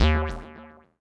Sound effects > Experimental
Analog Bass, Sweeps, and FX-201
korg, sci-fi, trippy, vintage, scifi, mechanical, weird, sweep, bass, dark, fx, sample, snythesizer, complex, electro, electronic, effect, machine, sfx, robotic, basses, analogue, alien, retro, bassy, analog, pad, oneshot, synth, robot